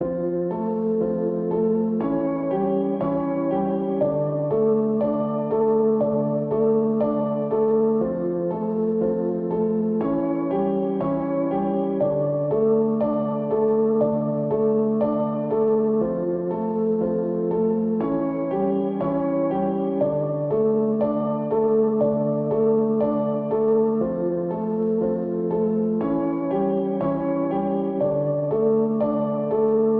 Music > Solo instrument
Piano loops 037 efect 4 octave long loop 120 bpm
120, 120bpm, free, loop, music, piano, pianomusic, reverb, samples, simple, simplesamples